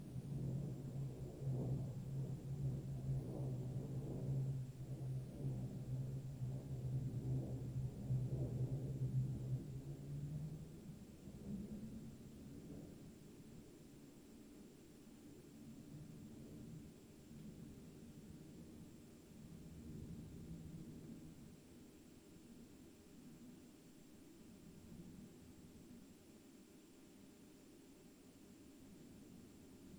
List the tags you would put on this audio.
Soundscapes > Nature
artistic-intervention
nature
alice-holt-forest
Dendrophone
raspberry-pi
soundscape
modified-soundscape
data-to-sound
phenological-recording
sound-installation
natural-soundscape
field-recording
weather-data

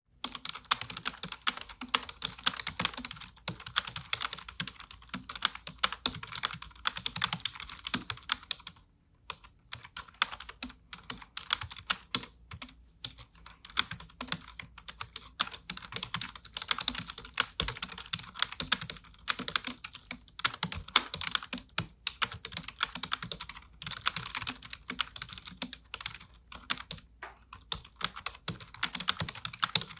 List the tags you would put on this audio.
Sound effects > Human sounds and actions
computer
field-recording
keyboard
keyboard-typing
typing

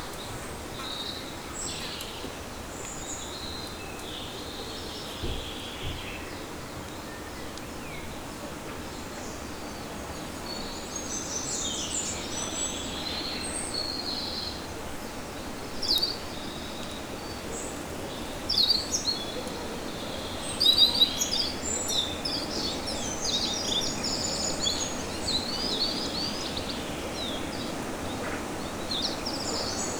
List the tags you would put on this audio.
Soundscapes > Nature
ambiance
birds
field-recording

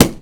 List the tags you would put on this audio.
Sound effects > Objects / House appliances
book
bang
pages

